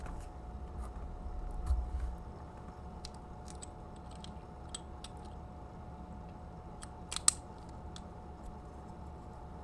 Sound effects > Objects / House appliances
FOLYProp-Blue Snowball Microphone, MCU Ink Cap, Open, Close Nicholas Judy TDC
An ink cap opening and closing.